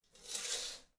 Sound effects > Objects / House appliances
Opening a window curtain 1
Opening a window curtain at a fast speed.